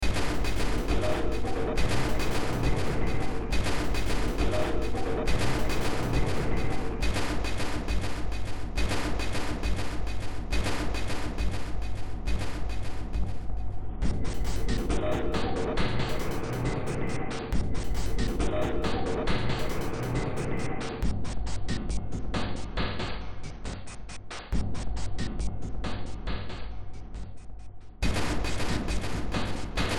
Music > Multiple instruments
Track taken from the Industraumatic Project.